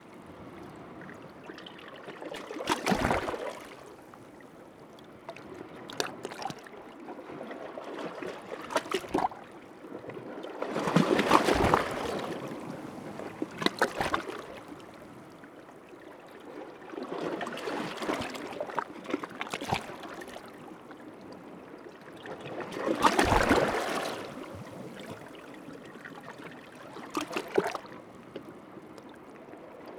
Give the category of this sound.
Soundscapes > Nature